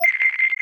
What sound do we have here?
Sound effects > Electronic / Design
Digital interface SFX created using in Phaseplant and Portal.
alert, confirmation, digital, interface, message, selection